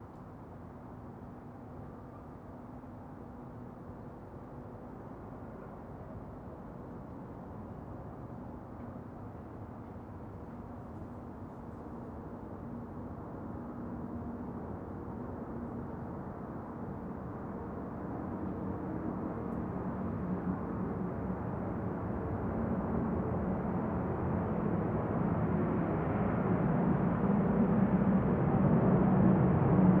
Sound effects > Vehicles
A large jet plane flies past from right to left 1
A large jet aircraft is performing an approach maneuver, recorded using a ZOOM F8N Pro recorder and a RODE NT-SF1 microphone. The recorded signal has been converted to stereo.
jet, takeoff, noise, airplane, landing, engines, maneuver, airport, flight